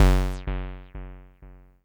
Sound effects > Experimental
Analog Bass, Sweeps, and FX-171
trippy, alien, weird, korg, synth, snythesizer, analogue, electro, bassy, oneshot, sample, sfx, machine, basses, mechanical, analog, vintage, scifi, sweep, dark, pad, bass, electronic, fx, robotic, effect, robot, complex, sci-fi, retro